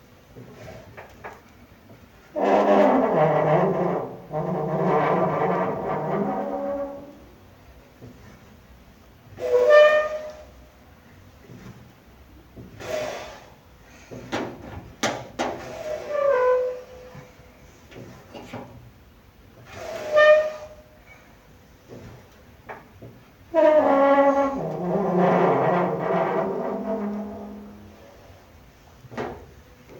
Sound effects > Objects / House appliances
Rusty locker door squeal / creak
Opening slowly a locker with rusty hinges. Recorded with Redmi 9 NFC. Good for games and movies